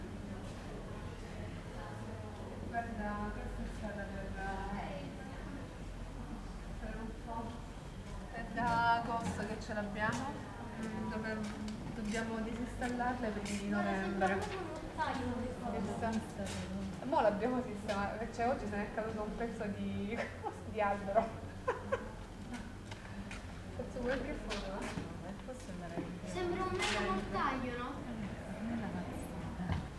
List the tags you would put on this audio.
Soundscapes > Urban
ambience marching-band outdoor Salento

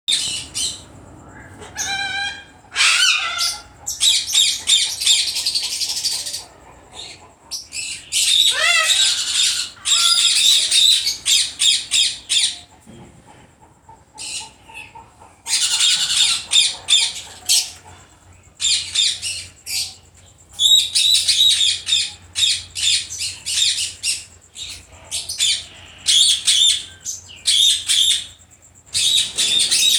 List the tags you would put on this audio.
Sound effects > Animals
aviary bird birds cockatoo exotic parakeet parrot ringneck tropical zoo

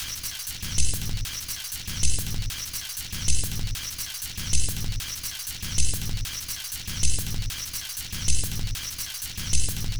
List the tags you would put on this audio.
Instrument samples > Percussion
Dark Ambient Packs Alien

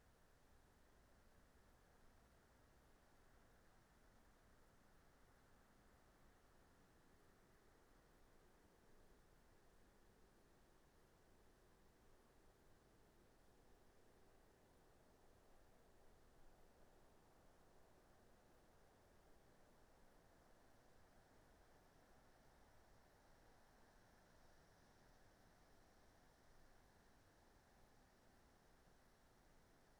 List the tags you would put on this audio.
Soundscapes > Nature
alice-holt-forest phenological-recording soundscape raspberry-pi natural-soundscape meadow nature field-recording

